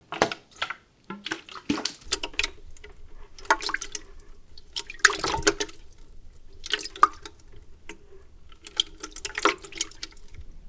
Sound effects > Objects / House appliances
Water sloshing about in a plastic watering can. Recorded with Rode NTG 5.